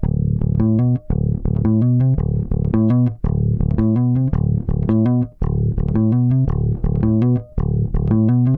Music > Solo instrument
blues rock riff 1
electric, slides